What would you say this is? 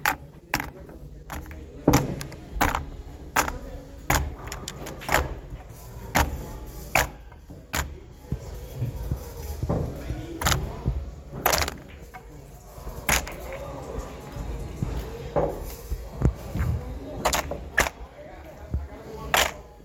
Sound effects > Objects / House appliances
FOLYProp-Samsung Galaxy Smartphone, CU Billiards, Balls, Into Box Nicholas Judy TDC
Billiards balls into box.
foley, box, Phone-recording, billiards, balls